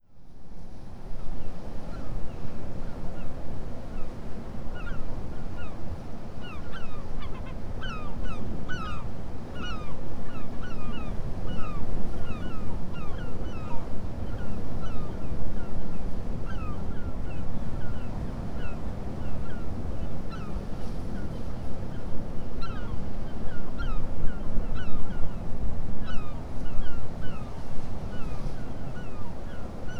Soundscapes > Nature
Herring Gulls overhead, distant waves below cliffs, strong wind. Recorded with: Tascam DR-05x